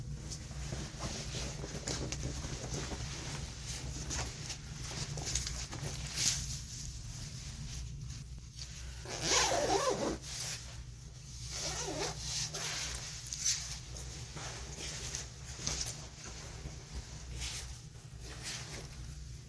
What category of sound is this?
Sound effects > Human sounds and actions